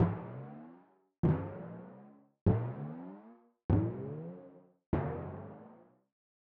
Sound effects > Other
Boingo Anime Cartoon Boob Bounce SFX
We're seeking contributors!